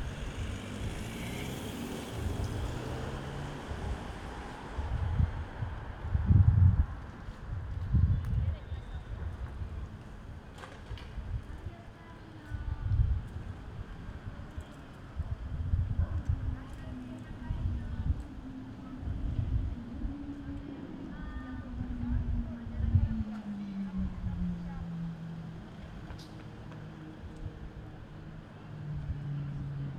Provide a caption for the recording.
Soundscapes > Urban
Outside of Almazora Park
Cars passing by on the road next to the park of Almazora, Castellón.
ambience, traffic, field-recording